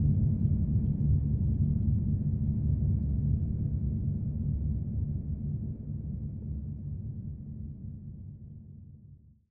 Sound effects > Other
Space Ship (Leaving)
A sound I made using Audacity!
boosters, science-fiction, starship